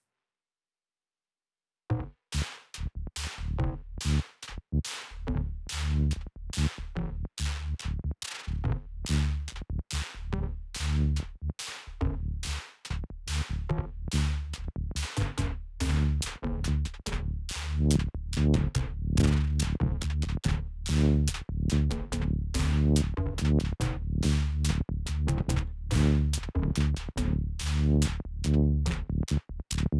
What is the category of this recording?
Music > Multiple instruments